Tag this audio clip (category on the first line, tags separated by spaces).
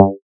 Instrument samples > Synths / Electronic
bass fm-synthesis